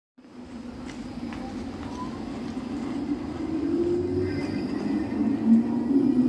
Soundscapes > Urban
final tram 33
tram, hervanta, finland